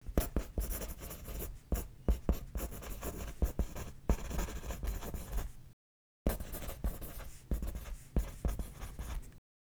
Sound effects > Objects / House appliances
Pencil write fast
Pencil scribbles/draws/writes/strokes fast.
pencil, write, draw